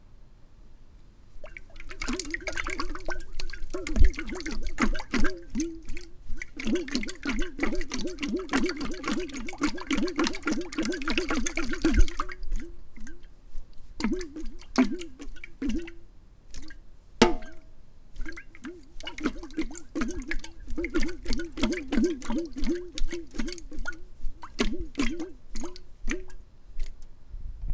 Sound effects > Objects / House appliances
Shaking a Mason Jar with Water and Lid
Shaking a mason jar with a lid filled halfway with water. Makes a sort of sloshy pinging sound. Recorded using a Zoom H1essential recorder
jar, kitchen, lid, shaking, water